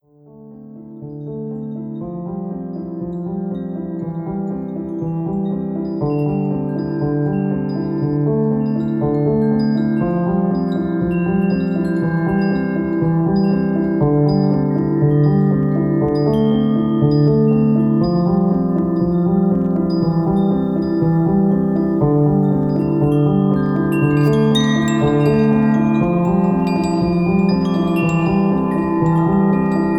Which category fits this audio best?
Instrument samples > Other